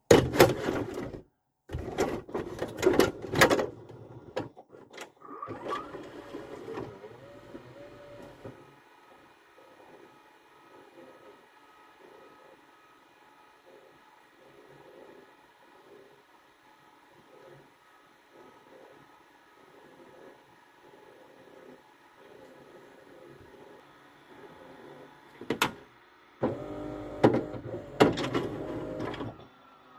Sound effects > Objects / House appliances
COMAv-Samsung Galaxy Smartphone VHS Tape Inserted to VCR, Start, Run, Stop, Eject, Insert Nicholas Judy TDC
A VHS tape being inserted into a VCR, starting, running, stopping, ejecting and inserting.
eject, insert, Phone-recording, run, start, stop, tape, vcr, vhs